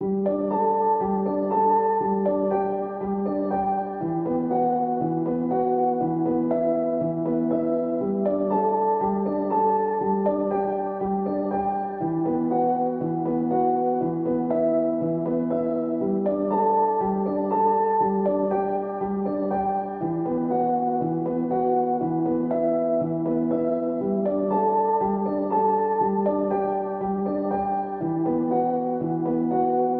Music > Solo instrument
Piano loops 197 efect 4 octave long loop 120 bpm
simple, 120, samples, loop, pianomusic, 120bpm, piano, free, simplesamples, music, reverb